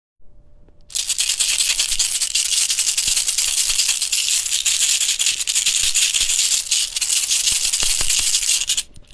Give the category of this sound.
Sound effects > Objects / House appliances